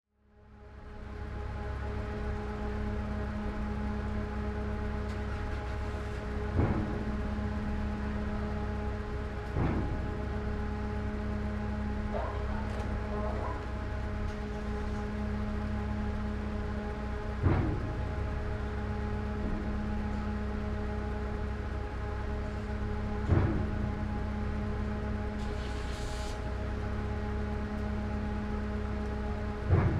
Soundscapes > Other
250810 202810 PH Ferry boat docking and unloading
Ferryboat docking and unloading. I made this recording from the deck of a ferryboat docking in the harbour of Calapan city (Oriental Mindoro, Philippines). First, one can hear some cars starting while the ship gets ready for docking. Then, several machineries will be activated (anchor, doors opening, and more), and the vehicles will start to exit. Recorded in August 2025 with a Zoom H5studio (built-in XY microphones). Fade in/out applied in Audacity.
machinery, noise, bang, atmosphere, ferry, ship, ferryboat, field-recording, unloading, loud, trucks, unload, port, vehicles, engine, cars, Calapan-city, Philippines, heavy, noisy, motorcycles, machine, docking, boom, dock, harbour, boat, pier, voices